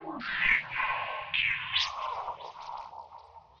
Soundscapes > Synthetic / Artificial
LFO Birdsong 33
bird, massive